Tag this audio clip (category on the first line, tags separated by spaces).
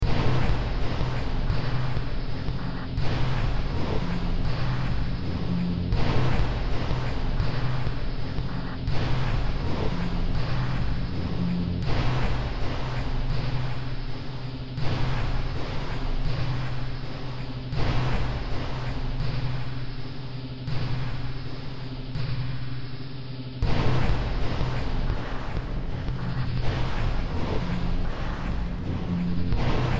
Music > Multiple instruments
Games Soundtrack Ambient Sci-fi Noise Industrial Underground Cyberpunk Horror